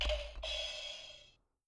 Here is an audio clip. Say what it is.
Sound effects > Objects / House appliances
TOYElec-Blue Snowball Microphone, MCU Rimshot Nicholas Judy TDC
An electronic toy rimshot.
drums, Blue-brand, toy, Blue-Snowball, rimshot, electronic